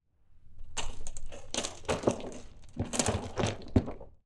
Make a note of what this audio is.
Sound effects > Experimental
small scale destruction
Sound of wood chips falling on bottom of a children's slide. Recorded with AT879 and mixed in Adobe Audition.